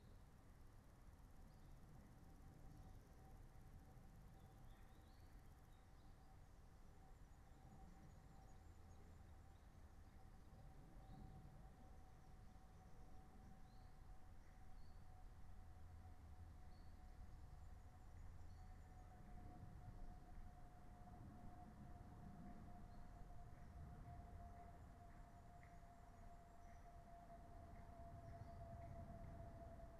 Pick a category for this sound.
Soundscapes > Nature